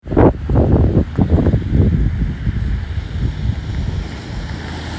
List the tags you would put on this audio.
Sound effects > Vehicles
auto car city field-recording street traffic

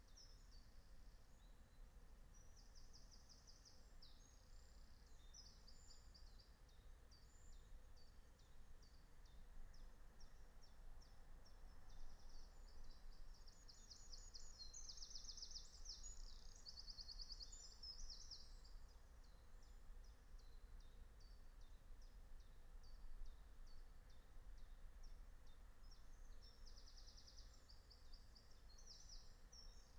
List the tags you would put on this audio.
Soundscapes > Nature
alice-holt-forest field-recording meadow natural-soundscape nature

